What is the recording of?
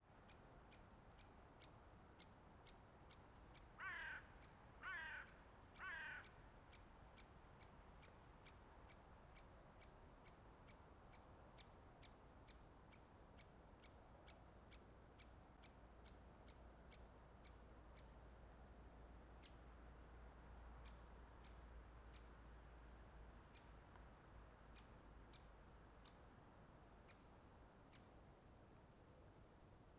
Soundscapes > Nature
Recorded that sound by myself with Recorder - H1 Essential